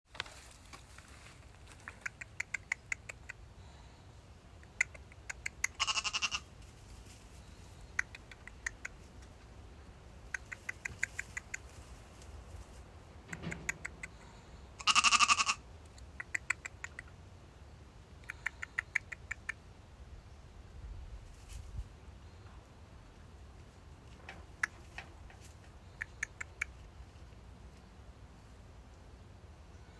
Soundscapes > Nature
Bottlefeeding Lance 04/28/2023
Bottle feeding baby lamb
Baby-lamb, bootle-feeding, farmland, field-recordings